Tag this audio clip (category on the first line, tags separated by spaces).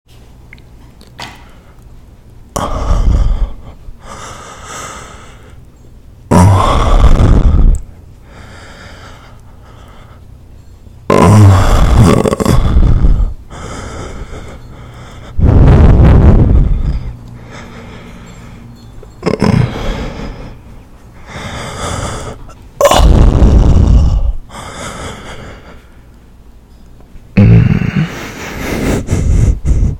Sound effects > Human sounds and actions
erotic hot male moan Nsfw